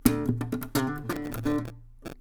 Music > Solo instrument
acoustic guitar slap 5
chord, solo, string